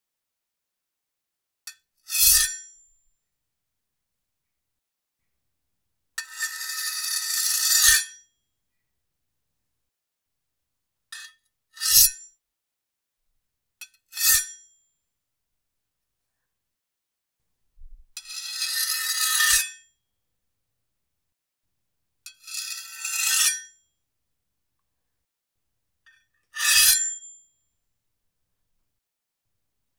Sound effects > Objects / House appliances
custom katana or spear slide scrape sounds 11302025
sounds of katana or spear scrape sounds: short; long; and longer variation. This sound was from a metal trowel sliding on hard floor in my family garage.
knight, war, weapon, martialarts, scrape, duel, battle, katana, sword, blade, attack, weapons, metal, spear, melee, medieval, fighting, combat, karate, slide, shing, knife, trowel, swords, fight, unsheathe, kung-fu